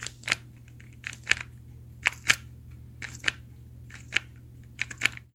Sound effects > Objects / House appliances
FOLYProp-Samsung Galaxy Smartphone, CU Spray Bottle, Pull Trigger, No Water Nicholas Judy TDC
A spray bottle trigger being pulled. No water.